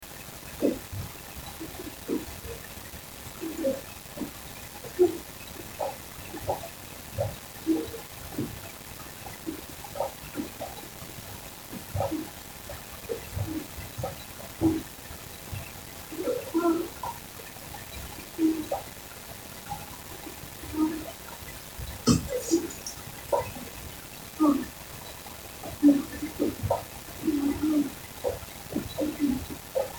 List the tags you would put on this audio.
Soundscapes > Indoors
female moan moaning orgasm sex

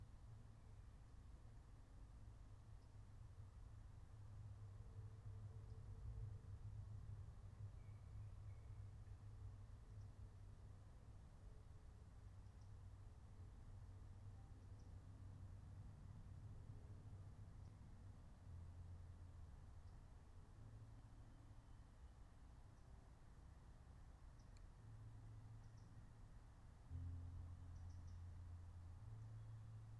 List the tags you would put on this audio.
Soundscapes > Nature
raspberry-pi nature soundscape phenological-recording natural-soundscape

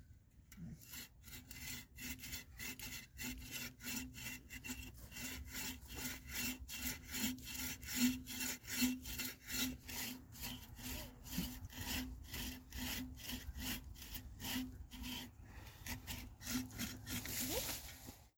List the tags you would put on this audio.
Sound effects > Objects / House appliances
Phone-recording saw tree fall foley

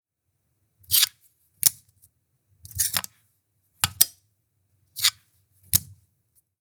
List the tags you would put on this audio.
Sound effects > Objects / House appliances
Cutlery effects Foley fork Freebie handling plastic PostProduction recording SFX Sound

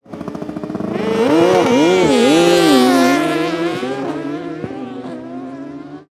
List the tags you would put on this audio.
Soundscapes > Other
Race 08-17-25 Drag 2025 Kiltsi Wildcards

